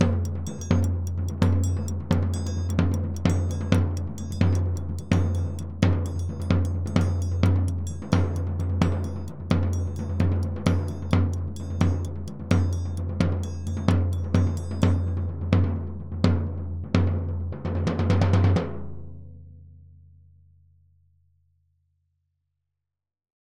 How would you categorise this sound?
Music > Solo percussion